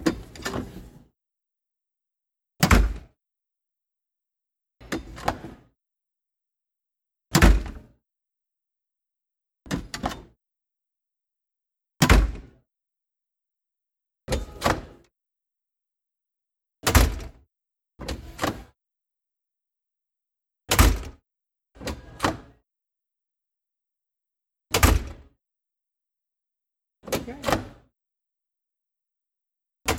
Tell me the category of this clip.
Sound effects > Objects / House appliances